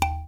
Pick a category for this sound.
Music > Solo instrument